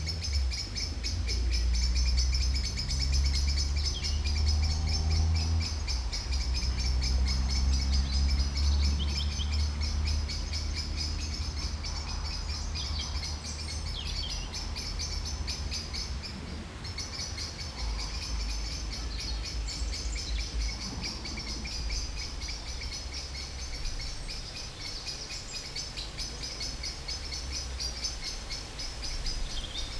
Soundscapes > Nature
250515 21h17 Albi - Parc Pratgraussals lac - West
Subject : Recording a park in Albi called PratGraussal, which has a lake. Date YMD : 2025 05 15 (Thursday) 21h17 Location : Albi 81000 Occitanie France. Hardware : Tascam FR-AV2, Rode NT5 in a ORTF configuration with WS8 windshields. Weather : Processing : Trimmed and Normalized in Audacity. Probably some fade in/out.
2025, 81000, Albi, birds, Field-Recording, FR-AV2, Graussals, May, NT5, Orat, ORTF, Park, Prat-Graussals, Rode, Tascam, thursday